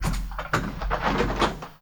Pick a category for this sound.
Sound effects > Objects / House appliances